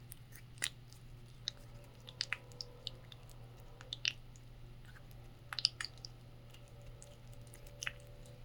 Sound effects > Human sounds and actions
Lotion being squelched in hand recorded on my phone microphone the OnePlus 12R